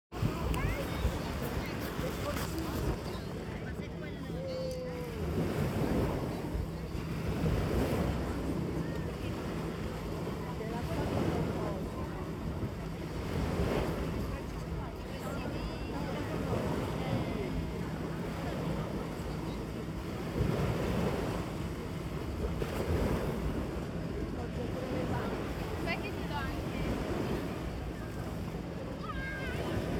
Soundscapes > Nature
Beach Sounds in Mondello, Palermo, Italy. September. Recorded with a phone.
beach, field-recording, italy, mondello, nature, palermo, sea, summer, voices, water, wind